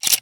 Sound effects > Electronic / Design
Sound of my camera clicking when taking a photo Camera is a Nikon D5200 Recorded with Blue Yeti Nano